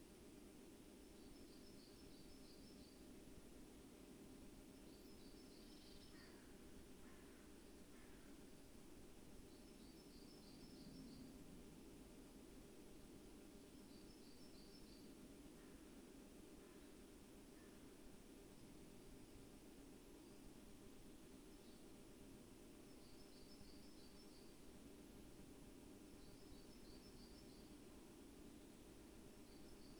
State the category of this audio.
Soundscapes > Nature